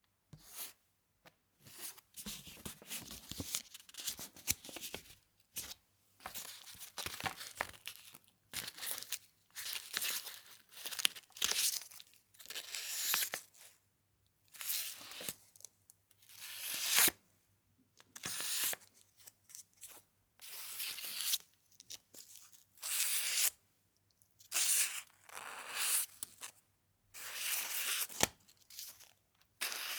Sound effects > Objects / House appliances
Paper Crumple and Shuffle
Sound of different paper crumples and shuffling and general moving around. Recorded for infographics with text sliding in and out in grungy style.
book, close, crumple, cut, foley, grunge, infographic, magazine, newspaper, noise, page, paper, rip, ripping, rough, rustle, sheet, shuffle, tear, tearing, text, texture, up